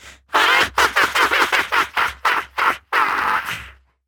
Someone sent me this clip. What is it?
Speech > Other
clown creepy3
Laugh of creepy clown Record with my own voice, edit by Voicemod
clown, horror, laugh